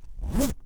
Sound effects > Objects / House appliances
A simple short sound of a zipper in decent quality.
zip, zipper, pants, backpack, jacket, zipping